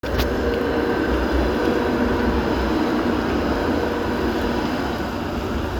Sound effects > Vehicles
tram-samsung-6
tram, vehicle, outside